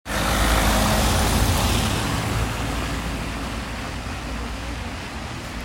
Sound effects > Vehicles
A car passing by from distance on Insinöörinkatu 23 road, Hervanta aera. Recorded in November's afternoon with iphone 15 pro max. Road is wet.
rain, Tampere, vehicle